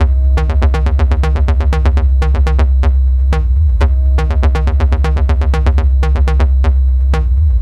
Music > Solo instrument
Synth/bass loops made with Roland MC-202 analog synth (1983)